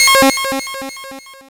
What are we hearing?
Sound effects > Electronic / Design
LOUD SOUND WARNING retro echo jingle idk
made in openmpt. a collectible sound possibly.
effect, echo, game, idk, sfx, retro, beep, bleep, jingle